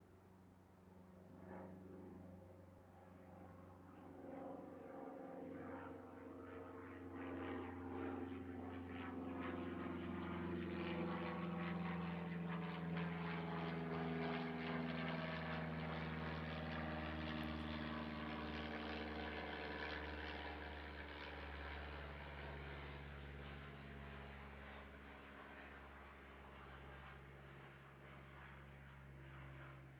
Soundscapes > Other
Small plane flyover 1
A small plane, most likely a Cessna, flies over the Riverdale neighbourhood of Whitehorse, Yukon on an August evening at around 10 p.m. Both the international airport and the Schwatka Lake float plane base are located near the neighbourhood, so small planes are constantly criss-crossing the local airspace at relatively low altitudes. Recorded on a Zoom H2n in 150-degree stereo mode.
plane, aviation, aircraft, flyover, yukon, propeller, field-recording, airplane, cessna